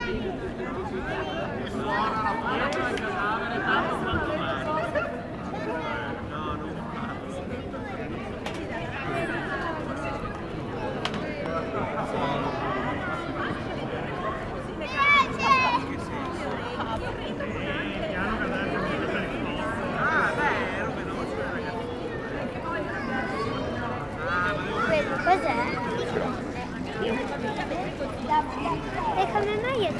Soundscapes > Urban
Italian Square at Sunday Lunch Time, Piazza del Duomo, Padua, Sunday
This was recorded in Piazza del Duomo in Padova, Italy. This square is enclosed between a church and some houses with porches on the street. It is Sunday after lunch time and people is walking around in the square after eating - chatting and having kids running around. It's a very typical sound for an italian living in Padova, which is a Medival kind of city with many squares :)
cafe, chat, chatter, chatting, field-recording, italian, italy, lunch, padova, padua, people, piazza, restaurant, square, sunday, talking